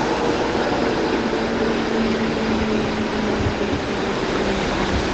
Sound effects > Vehicles
tram passing by away
Tram passing by and continuing onwards at a steady speed in an urban environment. Recorded from an elevated position near the tram tracks, using the default device microphone of a Samsung Galaxy S20+. TRAM: ForCity Smart Artic X34